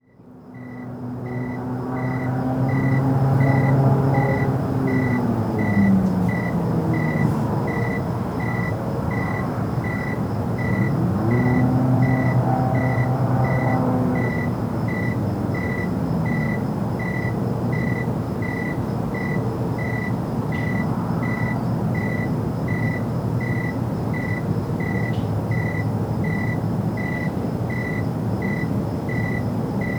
Sound effects > Animals
Crickets 2 Davis 08112025
Crickets while plane flies overhead.
prop, Crickets